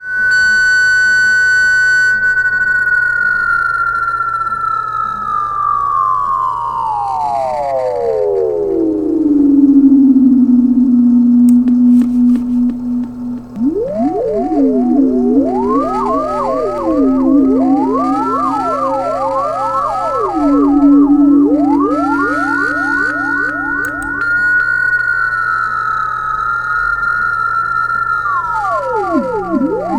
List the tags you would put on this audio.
Sound effects > Electronic / Design
1950s
ethereal
outer-space
sci-fi
space
theremin

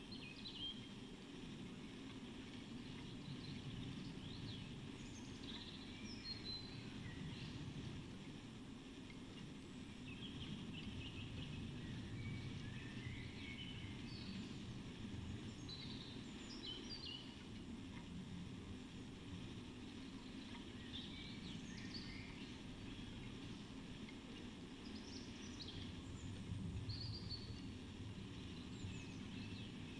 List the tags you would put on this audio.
Soundscapes > Nature
phenological-recording
data-to-sound
soundscape
sound-installation
raspberry-pi
field-recording
alice-holt-forest
Dendrophone
nature
weather-data
natural-soundscape
modified-soundscape
artistic-intervention

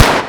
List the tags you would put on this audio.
Instrument samples > Percussion
alien-snare
bang
break
collapse
fake-crash
fakedrum
fall
noiseburst
percussive
toppling

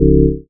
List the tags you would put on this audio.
Instrument samples > Synths / Electronic
fm-synthesis
bass

